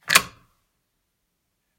Sound effects > Other mechanisms, engines, machines
Mechanical switch (latch) 02
One mechanical toogling sound, cool for switch, lock, opening or closing mechanism. The sound is from a door latch, made of wood, in a luxurious hotel. When I heard it, I immediately loved it, so I wanted to record it for a nice foley. Unfortunately, I only had my iPhone on hand, so the quality is limited. Recorded with an iPhone, but under ideal conditions. And then processed with RX11 and a few plugin. This sound is only 1 switch, but there are more in the pack.
button, chest, mechanism, lock, door, click, closing, sfx, open, wood, latch, opening, realistic, short, gate, mechanical, foley, toggle, close, switch